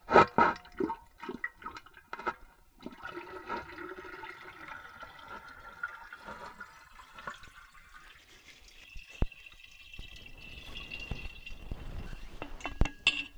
Sound effects > Experimental
contact mic in metal thermos, emptying1
Water being poured out of a thermos recorded with a contact microphone. Somewhat quiet.
contact-mic, experimental, contact-microphone, thermos, water, water-bottle